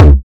Instrument samples > Percussion
BrazilFunk Kick 23
BrazilFunk, BrazilianFunk, Distorted, Kick